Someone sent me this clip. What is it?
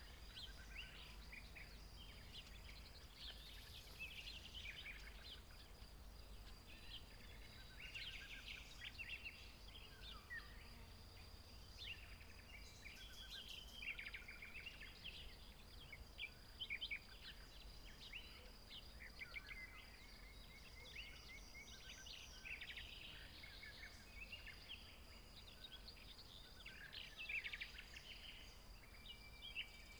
Soundscapes > Nature
AMBPark Park trail spring morning bird dominant FK Local
Recording done on a bench by a walking-running trail.
birds, nature, trail